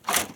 Sound effects > Other
Plastic colliding. Recorded with my phone.
impact, plastic